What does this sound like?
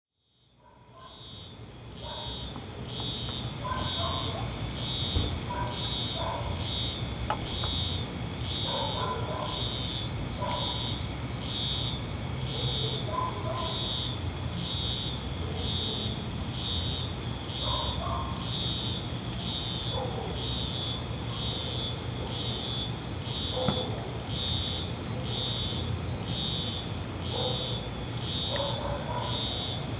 Soundscapes > Urban
The colonial town of Santa Lucia was founded in the 16th century and is famous for its traditional silver filigree jewelry craftsmanship.